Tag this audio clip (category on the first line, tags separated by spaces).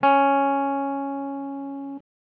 Instrument samples > String
stratocaster electricguitar electric guitar